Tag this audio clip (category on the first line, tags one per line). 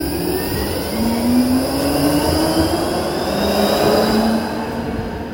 Sound effects > Vehicles
Tampere
tram
vehicle